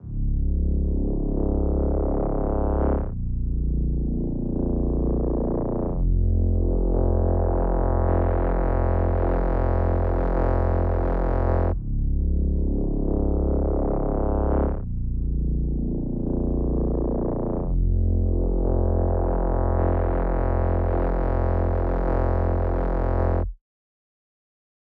Music > Solo instrument
above the clouds bass 82bpm 1lovewav
1lovewav, bass, loop, processed, sample, synth, synth-bass